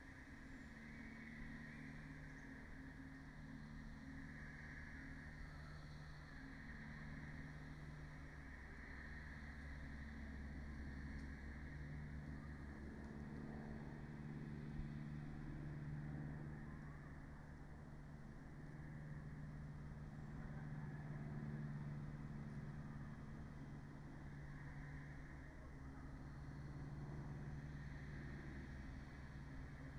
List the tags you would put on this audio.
Soundscapes > Nature
natural-soundscape modified-soundscape data-to-sound soundscape Dendrophone alice-holt-forest field-recording sound-installation

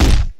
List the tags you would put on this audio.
Instrument samples > Synths / Electronic
bass-drum kick-drum drum-kit sample kick

